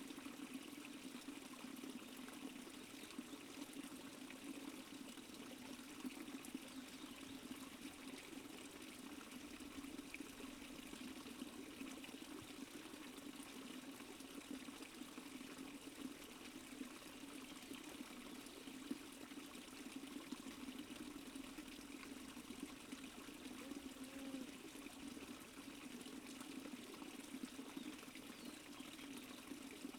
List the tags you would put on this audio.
Soundscapes > Nature
flow
water
creek
birds
field-recording

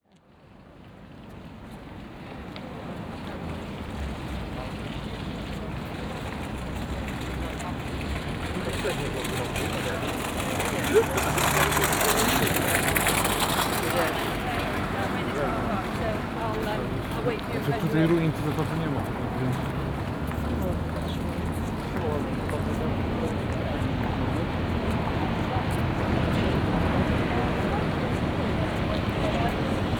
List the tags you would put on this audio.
Soundscapes > Urban
Cardiff
City
Citycentre
fieldrecording
urban

